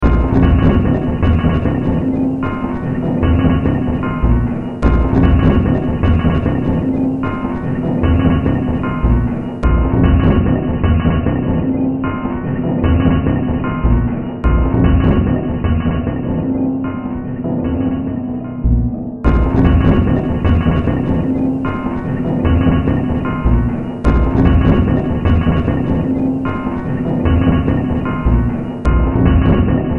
Music > Multiple instruments
Demo Track #3011 (Industraumatic)
Horror, Sci-fi, Noise, Games, Cyberpunk, Industrial, Ambient